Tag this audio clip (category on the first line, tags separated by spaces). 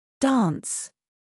Speech > Solo speech
voice english pronunciation word